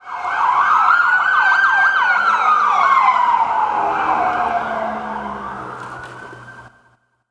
Sound effects > Vehicles
A group of sheriff department vehicles en route to a call, the valley allows for a nice reverb effect. Field recording performed on Android 16 with the application KOALA SAMPLER november 2025 in southern california united states